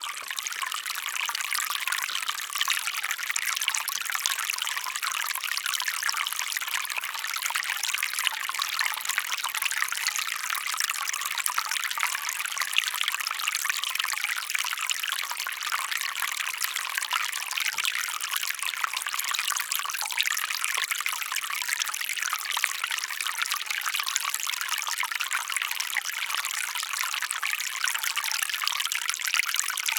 Soundscapes > Nature
Small Low Marble Stream Water
creek, river, stream, Water, waterstream